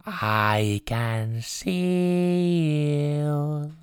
Speech > Solo speech
i can see you
movies, man